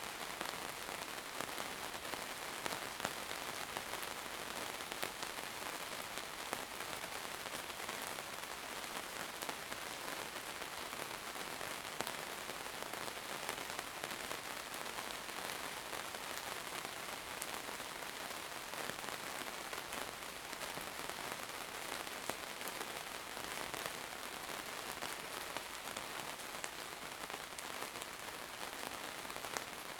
Soundscapes > Nature
24h ambiance pt-06 - 2025 04 16 07h41 - 09H00 Gergueil Greenhouse
Subject : One part out of ten of a 24h MS recording of Gergueil country side. Recorded inside a Greenhouse. Date YMD : Project starting at 20h20 on the 2025 04 15, finishing at 20h37 on the 2025 04 16. Location : Gergueil 21410, Côte-d'Or, Bourgogne-Franche-Comté. Hardware : Zoom H2n MS, Smallrig Magic-arm. At about 1m60 high. Weather : Rainy, mostly all night and day long. Processing : Trimmed added 5.1db in audacity, decoded MS by duplicating side channel and inverting the phase on right side. (No volume adjustment other than the global 5.1db).